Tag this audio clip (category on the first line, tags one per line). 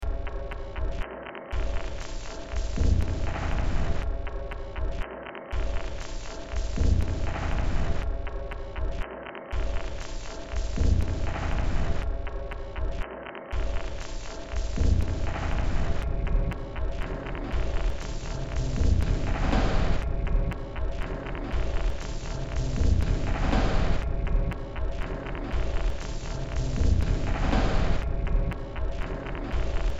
Music > Multiple instruments
Soundtrack
Games